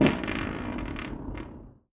Sound effects > Human sounds and actions
A prolonged fart.
cartoon, fart, flatulance, Phone-recording, prolonged